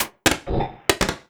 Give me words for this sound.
Sound effects > Electronic / Design
Whatever bullet you loaded sounds like it's got some extra spice to it. Magic, perhaps? Variation 4 of 4.